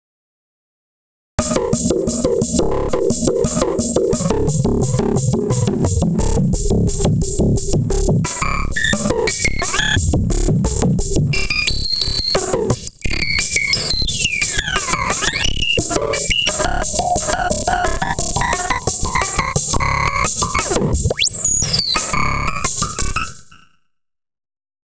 Music > Solo percussion
Simple Bass Drum and Snare Pattern with Weirdness Added 055
Bass-and-Snare, Experiments-on-Drum-Beats, FX-Drums, Glitchy, Noisy, Silly, Snare-Drum